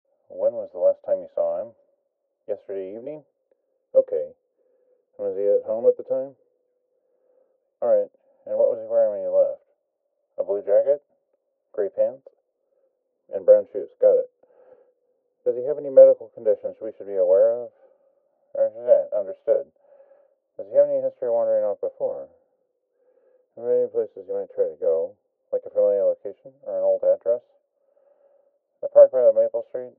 Speech > Other
Someone on the other end of phone line
Male voice on the other end of a phone call. This sort of represented a "missing persons" call, but you can't really tell what the caller is saying.
call, male, message, phone, telemarketer, telephone, voicemail